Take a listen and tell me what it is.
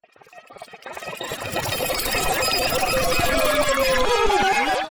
Sound effects > Electronic / Design
Optical Theremin 6 Osc Destroyed-019
Alien, Analog, Bass, Digital, DIY, Dub, Electro, Electronic, Experimental, FX, Glitch, Glitchy, Handmadeelectronic, Infiltrator, Instrument, Noise, noisey, Optical, Otherworldly, Robot, Robotic, Sci-fi, Scifi, SFX, Spacey, Sweep, Synth, Theremin, Theremins, Trippy